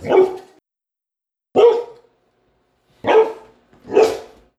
Sound effects > Animals
ANMLDog-Samsung Galaxy Smartphone, CU Large Dog, Barking Nicholas Judy TDC
A large dog barking. Performed by Peter's dog, Sirius.
barking, dog, large, Phone-recording